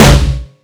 Instrument samples > Percussion
fatsnare dist 1
fake/wrong as a standalone snare A distorted mix/soundblend of many of my snares (see snare folder). I used WaveLab 11.